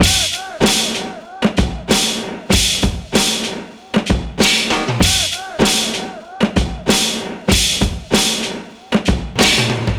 Music > Solo percussion
bb drum break loop hey 96
Vinyl, Breakbeat, DrumLoop, Acoustic, Break, 96BPM, Drum-Set, Dusty, Drum, Drums